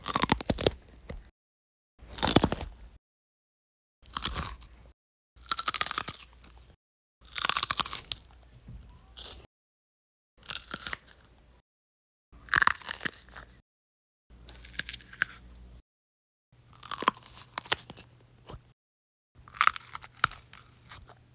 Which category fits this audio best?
Sound effects > Human sounds and actions